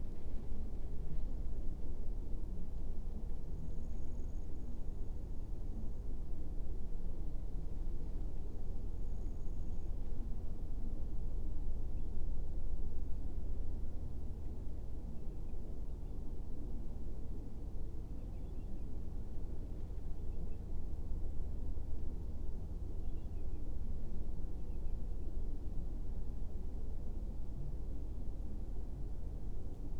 Soundscapes > Nature
Very beautiful park. Plenty of bird sounds arguably interrupted by large cargo boat ships traversing in the distance. #09:24 - Weird Sound! #09:40 - Weird Rumble! #10:00 - Bird Call 2 (DST), again #14:05 - Bird Call 7 (DST) #14:19 - Bird Call 7 (DST), again #17:54 - Bird Call 8 (DST) #18:03 - Edward the Fly #22:12 - Bird Calls Mix #00:53 - Bird Call 1 (MED) #01:09 - Edward the Fly #01:46 - Bird Call 2 (DST) #01:51 - Bird Call 1 (DST) #02:05 - Bird Call 3 (MED) #02:10 - Boat Continues Approaching #03:46 - Bird Call 4 (DST) #04:26 - Bird Call 5 (DST) #05:04 - Boat Gets Louder #05:38 - Bird Call 1 (MED) #06:58 - Me Walking Around (SORRY!) #07:08 - Bird Call 6 (MED) #07:23 - Bird Call 6 (MED) (CONT) #07:40 - Bird Call 7 (MED) (Same bird as 6?) #08:04 - Stupid Plane #08:42 - Long Bird Call Mix! #08:46 - Bird Call 8 (MED) #08:53 - Bird Call 1 (MED), again #09:05 - Bird Call 10 (MED) #09:48 - Bird Call 11 (MED) #10:21 - Bird Call 12 (MED) #10:29 - Bird Call 13 (MED) (Same bird as 13?)